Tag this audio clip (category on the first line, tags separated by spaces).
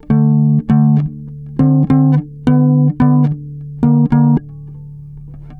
Instrument samples > String
mellow riffs charvel blues plucked bass fx electric oneshots loops